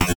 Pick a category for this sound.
Sound effects > Electronic / Design